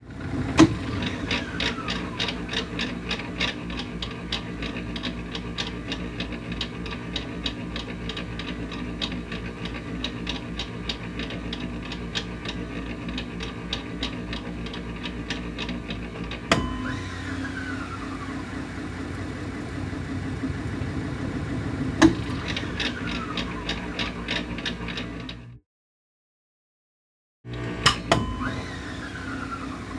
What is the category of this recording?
Sound effects > Other mechanisms, engines, machines